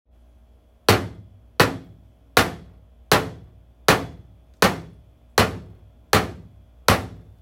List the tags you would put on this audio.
Sound effects > Other
shot firing pistol shooting weapon gun

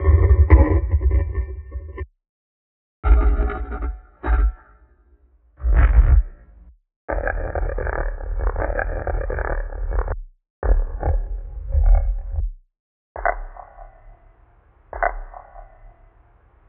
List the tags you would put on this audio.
Sound effects > Experimental
recorded sample saltrock techno deep

